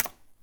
Sound effects > Other mechanisms, engines, machines
metal shop foley -202
bam, bang, boom, bop, crackle, foley, fx, knock, little, metal, oneshot, perc, percussion, pop, rustle, sfx, shop, sound, strike, thud, tink, tools, wood